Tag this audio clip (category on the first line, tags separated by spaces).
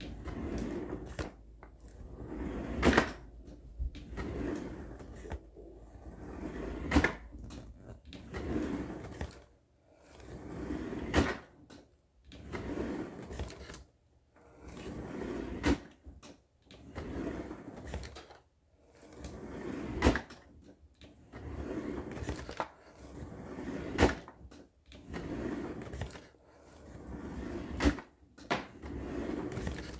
Sound effects > Objects / House appliances
close drawer foley kitchen open Phone-recording slide